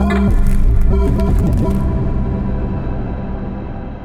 Instrument samples > Synths / Electronic
CVLT BASS 43
synthbass, wobble, drops, bassdrop, sub, low, lfo, clear, wavetable, synth, lowend, bass, subwoofer, stabs, subs, subbass